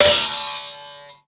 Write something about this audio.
Sound effects > Objects / House appliances
shot-Bafflebanging-7
Banging and hitting 1/8th inch steel baffles and plates
banging, impact